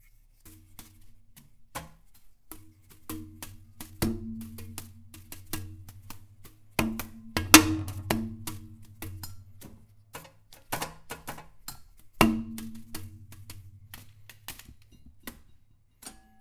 Sound effects > Objects / House appliances
paint brush drum beat foley-002
wiping, brush, soft, shop, brushing, surface, paint